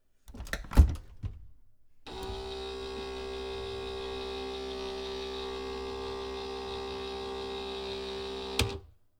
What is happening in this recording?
Sound effects > Objects / House appliances
dishwasher automatic door opener retracts
The sound of a dishwasher automatic door opener being retracted. Recorded with the Sound Devices MixPre-6 recorder and the Rode NTG3 microphone.
dish, dishwasher, dooropener, automatic, Rode, pull, retract, Sound-Devices, cleaner, move, machine, lever, opener, NTG3, MixPre-6, door, cleaning, dishes